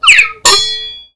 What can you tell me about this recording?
Sound effects > Other
Slip and fall. A quick slide whistle down and honk-bang.